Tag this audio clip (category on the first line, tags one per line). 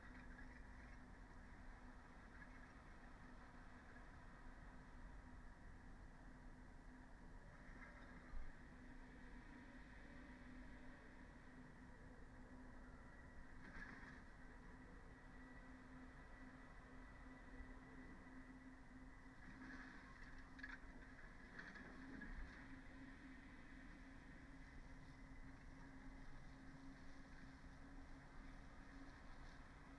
Soundscapes > Nature
data-to-sound
phenological-recording
alice-holt-forest
natural-soundscape
soundscape
weather-data
Dendrophone
sound-installation
artistic-intervention
nature
field-recording
modified-soundscape
raspberry-pi